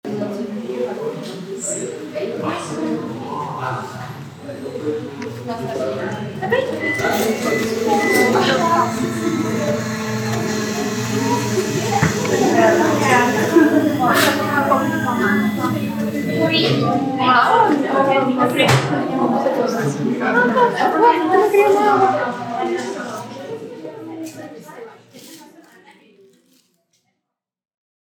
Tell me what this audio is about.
Soundscapes > Urban
Restaurant ambient sound with the coffee machine
people, food, soundscape